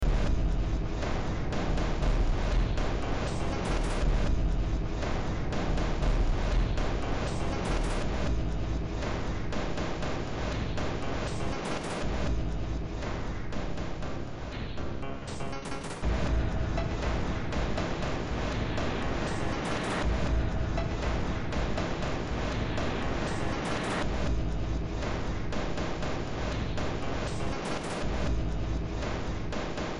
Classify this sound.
Music > Multiple instruments